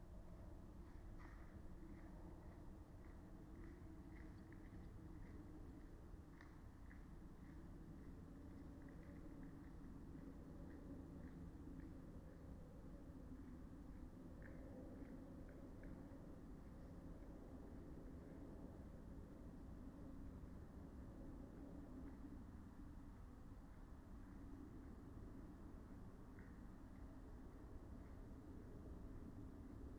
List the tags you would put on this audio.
Soundscapes > Nature
phenological-recording
alice-holt-forest
nature
field-recording
soundscape
raspberry-pi
meadow
natural-soundscape

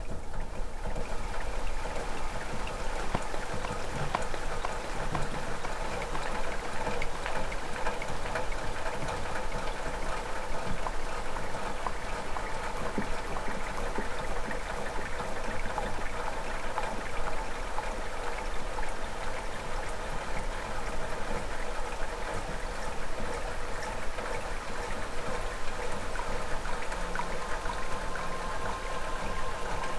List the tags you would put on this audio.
Soundscapes > Synthetic / Artificial
nature
ambient
electronic

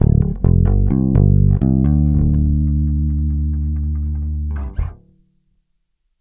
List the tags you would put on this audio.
Instrument samples > String
bass
blues
charvel
loops
pluck
rock

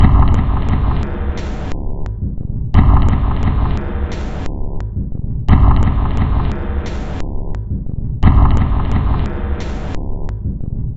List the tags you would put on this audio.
Instrument samples > Percussion
Alien
Ambient
Dark
Industrial
Loopable
Samples
Soundtrack
Underground
Weird

